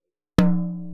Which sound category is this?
Music > Solo percussion